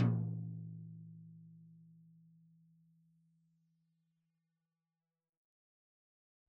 Music > Solo percussion
Med-low Tom - Oneshot 1 12 inch Sonor Force 3007 Maple Rack
quality, roll, realdrum, drums